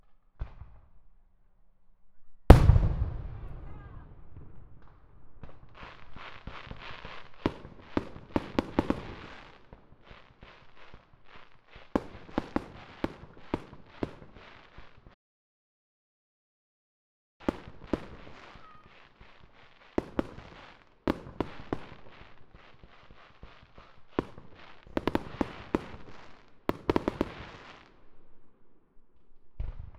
Soundscapes > Urban
fuegos tala 2025
Talamanca del Jarama 2025 fireworks. Recorded with Nagra LB and Neumann KU100
ambience, ambient, Binaural, field-recording, Fireworks, KU100, Neumann